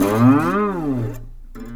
Music > Solo instrument
acoustic guitar slide18
acosutic, chord, chords, dissonant, guitar, instrument, knock, pretty, riff, slap, solo, string, strings, twang